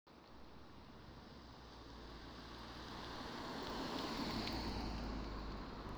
Sound effects > Vehicles
tampere car8
automobile, vehicle, car